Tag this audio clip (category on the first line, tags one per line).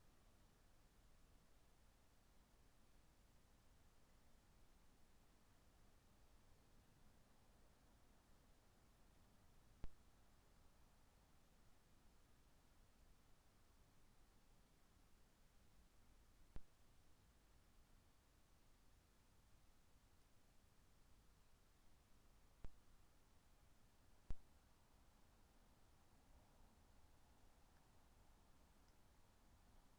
Soundscapes > Nature
alice-holt-forest
data-to-sound
field-recording
modified-soundscape
raspberry-pi